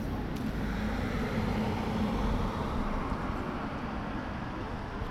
Sound effects > Vehicles
Car 2025-10-27 klo 20.12.58
Car, Field-recording, Finland